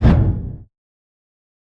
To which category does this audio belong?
Sound effects > Other